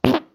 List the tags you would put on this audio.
Sound effects > Other
flatulence
fart
gas

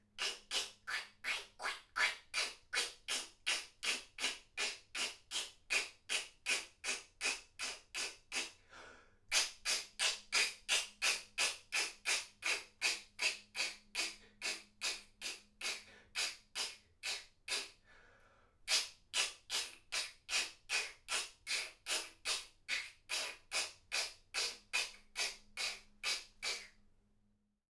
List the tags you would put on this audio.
Sound effects > Human sounds and actions
alternate
Tascam
single
weird
indoor
Rode
person
applause
XY
individual
solo-crowd
experimental
Alien
original
NT5
FR-AV2

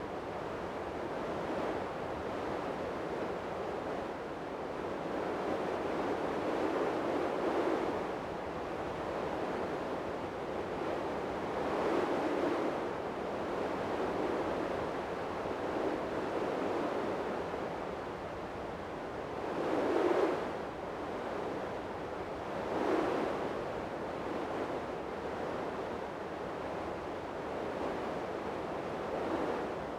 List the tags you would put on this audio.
Sound effects > Electronic / Design
Nature
Wind
Windy

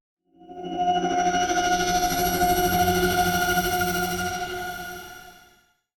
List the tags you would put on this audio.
Sound effects > Electronic / Design
high-pithced
reverse
magic
shimmering
crystal
tremelo

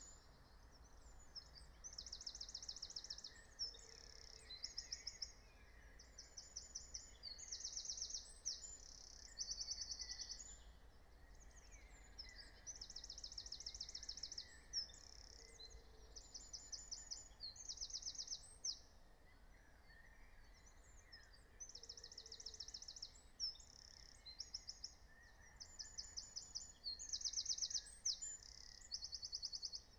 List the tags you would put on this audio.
Soundscapes > Nature
raspberry-pi
meadow
nature
field-recording
soundscape
natural-soundscape
alice-holt-forest
phenological-recording

